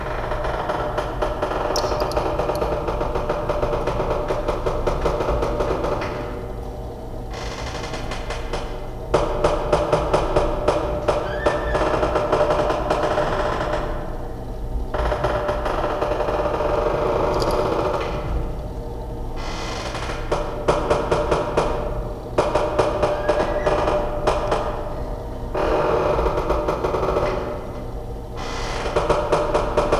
Sound effects > Objects / House appliances
A creaking ship. Sound design.